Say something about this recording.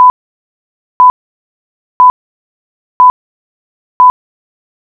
Sound effects > Electronic / Design
five second beep
This is a 5 second time warning beeping sound generated using AI.
beep, countdown, timer